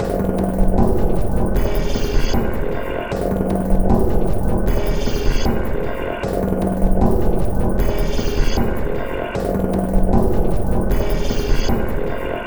Instrument samples > Percussion
This 154bpm Drum Loop is good for composing Industrial/Electronic/Ambient songs or using as soundtrack to a sci-fi/suspense/horror indie game or short film.
Loop, Industrial, Soundtrack, Dark, Packs, Samples, Loopable, Drum, Underground, Ambient